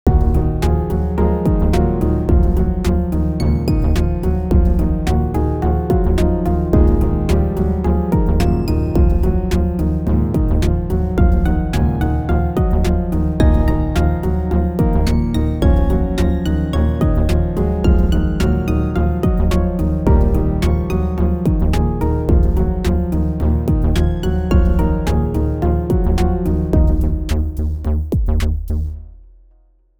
Music > Multiple instruments
verttkey Looop (trip hop) 108bpm
a trill triphop beat and melody produced in FL Studio with Phase Plant and Fab Filter